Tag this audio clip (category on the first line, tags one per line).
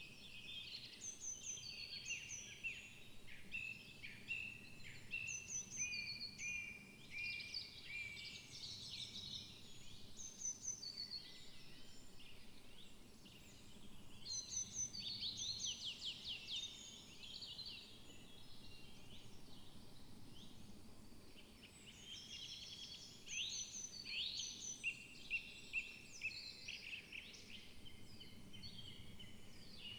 Soundscapes > Nature
Dendrophone
nature
field-recording
weather-data
modified-soundscape
natural-soundscape
raspberry-pi